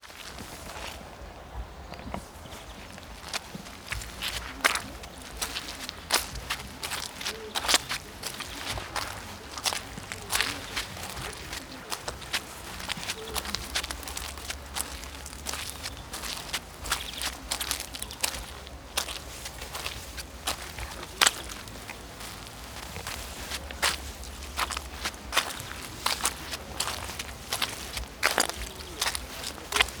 Sound effects > Human sounds and actions
FEETHmn-XY Zoom H4e Admin Camp-Walking in the mud SoAM Piece of Insomnia 2025 Road inside Admin camp to the Volunteer Mess Tent
Fade In\OuFade In\Out 0.5 sec, Low Shelf about -6Db A Piece of Insomnia 2025 This is a small field recording library capturing a day in the life of volunteers and attendees at one of the world's most renowned international animation festivals. All audio was recorded on a single day—July 20, 2025. Immerse yourself in the atmosphere of a digital detox: experience how the festival's participants unwind far from the urban hustle, amidst vast fields and deep forests, disconnected from the internet and cellular networks. Кусочек «Бессонницы» 2025 Это маленькая шумовая библиотека, состоящая из полевых записей, запечатлевшая один день из жизни волонтёров и посетителей одного из самых известных в мире международных фестивалей анимации. Все аудиодорожки были записаны в один день — 20 июля 2025 года. Погрузитесь в атмосферу цифрового детокса: услышьте, как участники фестиваля отдыхают вдали от городской суеты, среди бескрайних полей и густых лесов, в отрыве от интернета и сотовой связи.